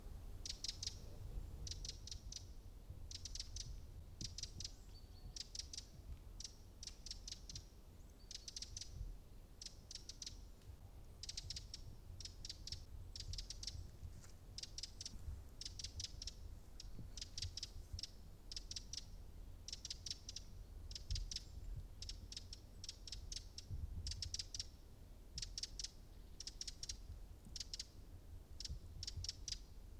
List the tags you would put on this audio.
Sound effects > Animals
wren
birds
birdsong
nature

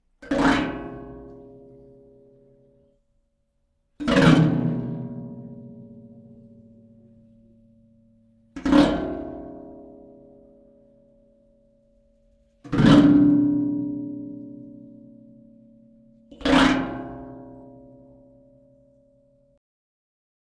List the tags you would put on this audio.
Sound effects > Other mechanisms, engines, machines
gas
geofone
grill
hotwater
sfx
strike
strum
strumming

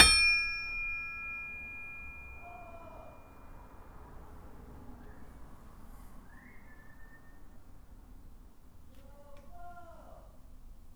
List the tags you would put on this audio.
Sound effects > Other mechanisms, engines, machines
foley
metal
rustle
shop
sound
tink
tools
wood

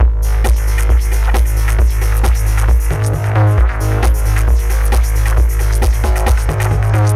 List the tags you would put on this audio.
Music > Solo percussion
134bpm
80s
Analog
AnalogDrum
Beat
CompuRhythm
CR5000
Drum
DrumMachine
Drums
Electronic
Loop
music
Roland
Synth
Vintage

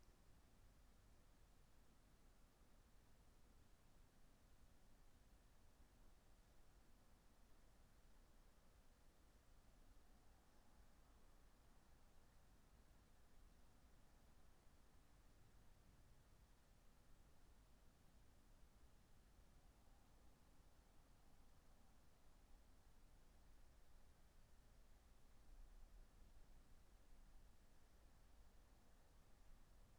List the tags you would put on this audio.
Soundscapes > Nature
alice-holt-forest
artistic-intervention
data-to-sound
Dendrophone
field-recording
natural-soundscape
nature
raspberry-pi
sound-installation
soundscape

